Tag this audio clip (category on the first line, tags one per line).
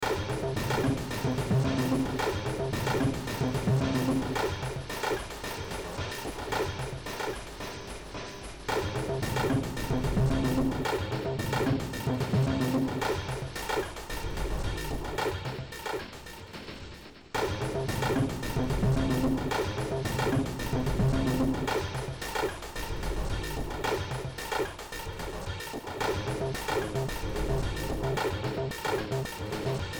Music > Multiple instruments
Ambient
Horror
Cyberpunk
Games
Underground
Sci-fi
Soundtrack
Noise